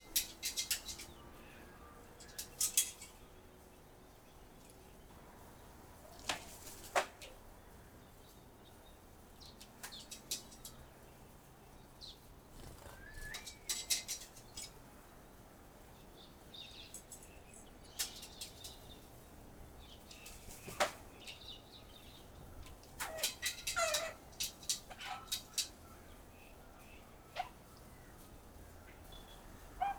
Sound effects > Animals
Finches and Robins moving about on a tin roof in a suburban backyard at Sunrise on an overcast winters day.